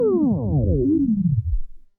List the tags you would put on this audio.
Sound effects > Electronic / Design
sound-design
digital
game-audio
sweep
sci-fi
glitch
synth
soundeffect
modulation
effect
wobble
electronic
electric

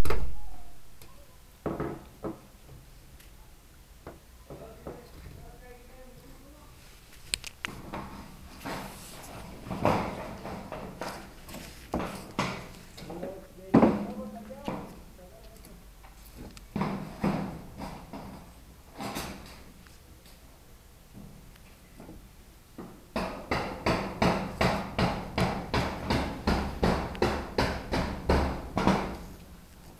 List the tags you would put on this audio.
Soundscapes > Urban
street-noise
impact

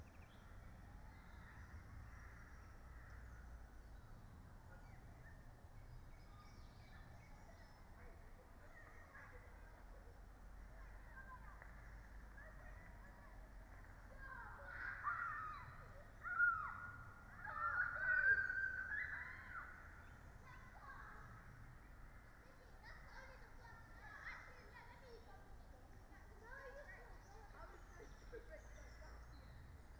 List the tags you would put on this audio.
Soundscapes > Nature
phenological-recording
raspberry-pi
artistic-intervention
weather-data
nature
modified-soundscape
soundscape
natural-soundscape
Dendrophone
data-to-sound
alice-holt-forest
sound-installation
field-recording

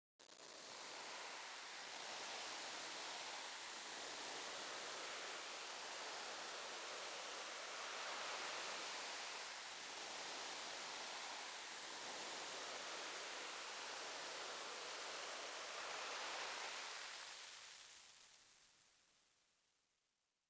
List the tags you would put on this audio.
Sound effects > Experimental
tape
noise
loop
ambient
texture
lo-fi
glitch
Hissing

